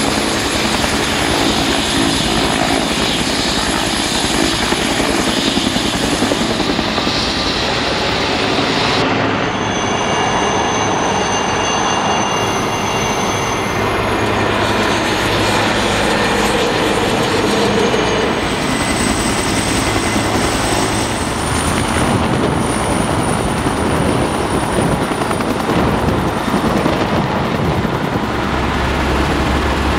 Sound effects > Vehicles
1. I merged many helicopter sounds in a stereo soundfile. 2. I cloned the soundfile 2 times creating a left channel only and a right channel only channel. 3. I mixed the 3 soundfiles but I sliced them in different points and shuffled them. 4. I boosted a small high frequency range and an even smaller bass 55 Hz close by range. 5. I used a brickwall limiter.